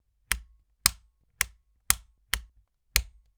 Sound effects > Objects / House appliances
Flipping Light Switch 1
The sound of a light switch being flicked on and off. Recorded with a 1st Generation DJI Mic and Processed with ocenAudio